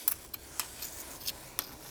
Sound effects > Objects / House appliances
Coin Foley 8
percusion, tap, coins, jostle, foley, jingle, change, perc, fx, sfx, coin